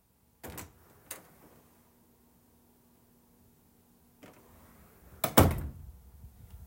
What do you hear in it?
Sound effects > Objects / House appliances
Door Open / Close
Me opening my bedroom door / closing it.
close, door, open, wooden